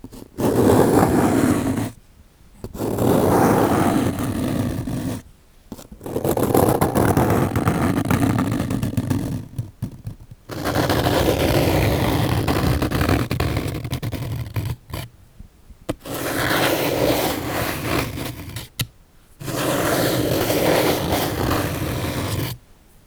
Sound effects > Objects / House appliances
Grinding Friction Foley
Scratching a cardboard box with recently cut fingernails at slightly varying intensity and speed that leans toward slow. 18x15.5x9cm shipping cardboard box with some tape and labels. Its content is the packaging of an Audient iD4 audio interface with the interface in it, so quite a heavy little box. The box was in my lap, as I was scratching one of the two biggest surfaces with one hand and holding the recorder with the other. I was sitting on a sofa.